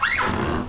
Sound effects > Objects / House appliances
Creaking Floorboards 06
footstep, hardwood, old, squeaking, rub, squeak, floor, going, squeal, screech, walking, old-building, weight, flooring, grind, creaky, creaking, scrape, floorboards, grate, wooden, walk